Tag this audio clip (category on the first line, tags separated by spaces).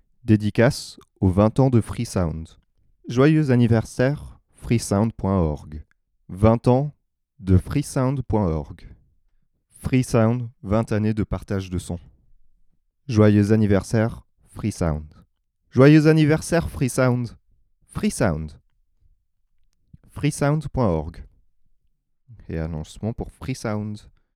Speech > Solo speech
2025 20s France FR-AV2 freesound20 French male multi-take Shure SM58 Tascam